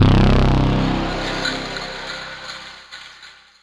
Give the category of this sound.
Instrument samples > Synths / Electronic